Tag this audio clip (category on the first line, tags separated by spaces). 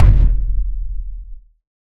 Instrument samples > Percussion
ashiko bata bongo bougarabou djembe drum dundun DW floor floortom kettledrum Ludwig ngoma Pearl percussion Premier Sonor tabla taboret talkdrum talking-drum talktom Tama tambour tam-tam tenor-drum timpano tom tom-tom Yamaha